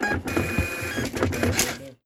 Sound effects > Objects / House appliances
A register receipt being printed out. Recorded at the United States Post Office.